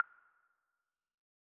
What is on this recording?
Sound effects > Electronic / Design
UI Confirm
Made with the Vital synth in FL Studio — [SFX: Confirm]. Designed for casual games.
confirm, pads